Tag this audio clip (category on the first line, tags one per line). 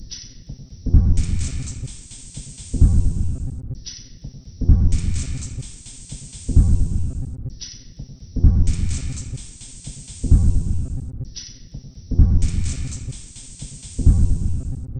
Instrument samples > Percussion
Samples
Dark
Industrial
Packs
Alien
Ambient
Soundtrack
Weird
Loopable
Loop
Drum
Underground